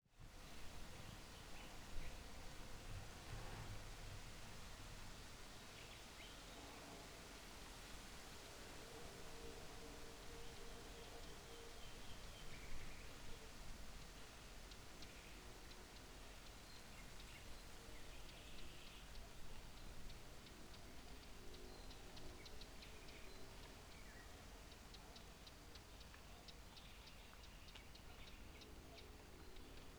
Soundscapes > Nature
AMBPark Park trail spring morning FK Local
Recording done on a bench by a walking trail.
field-recording, nature, trail